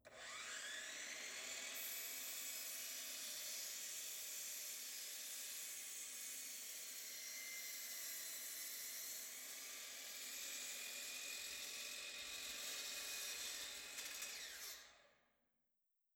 Sound effects > Other mechanisms, engines, machines
TOOLPowr-Distant Electric Saw, Cutting Wood Nicholas Judy TDC
An electric saw cutting wood in distance.
distance, wood